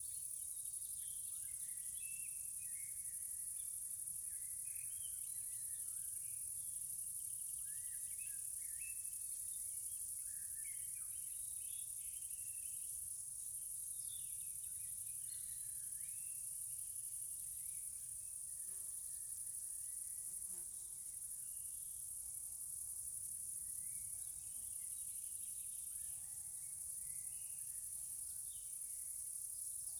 Soundscapes > Nature
orthoptères Field
Orthoptera - crickets, cicadas, grillons... - singing in a glade, 8PM, june 2025, Bourgogne. Birds in the background.
birds, bourgogne, cicadas, crickets, field-recording, insects, nature, Orthoptera, summer